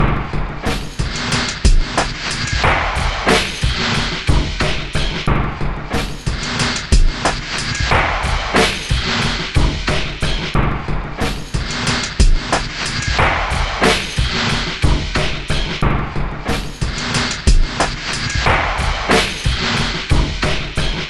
Instrument samples > Percussion
This 91bpm Drum Loop is good for composing Industrial/Electronic/Ambient songs or using as soundtrack to a sci-fi/suspense/horror indie game or short film.
Weird, Loopable, Loop, Underground, Alien, Packs, Soundtrack, Samples, Dark, Industrial, Ambient, Drum